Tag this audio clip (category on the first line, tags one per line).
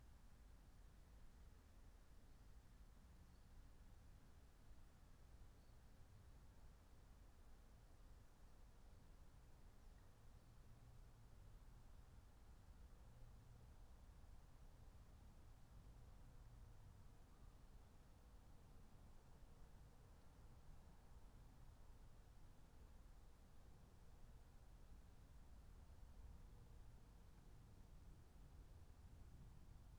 Soundscapes > Nature
sound-installation
raspberry-pi
natural-soundscape
alice-holt-forest
weather-data
field-recording
Dendrophone
soundscape